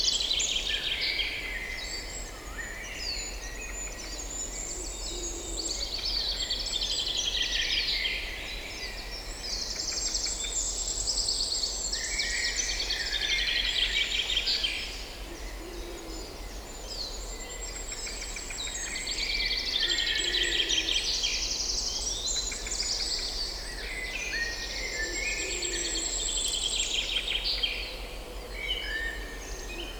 Soundscapes > Nature
5am Dawn Chorus in the Forest (Bedgebury Forest)
📍 Bedgebury Pinetum & Forest, England 12.05.2025 5am Recorded using a pair of DPA 4060s on Zoom F6
birdsong, dawn-chorus, field-recording, long, morning, nature, spring